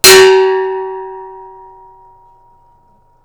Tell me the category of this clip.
Sound effects > Objects / House appliances